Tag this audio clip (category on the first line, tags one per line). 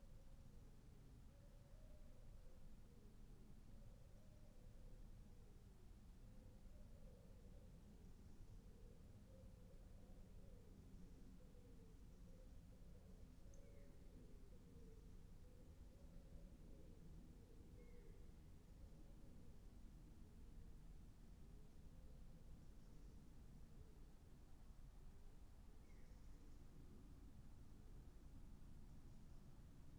Soundscapes > Nature
artistic-intervention
weather-data
phenological-recording